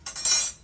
Sound effects > Objects / House appliances
Cây Gắp Rớt - Metal Object
Metal object fall in kitchen. Record use iPad 2 Mini, 2020.08.15 11:34